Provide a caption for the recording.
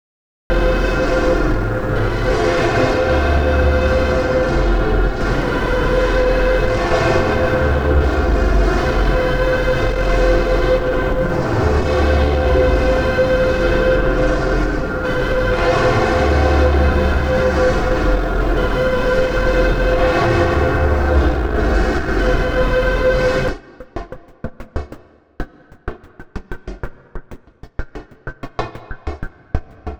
Instrument samples > Synths / Electronic
Wizard Peter Morphagene Reel 1
Wizard Peter presents Morphagene Reel 1 for the Make Noise Morphagene Eurorack module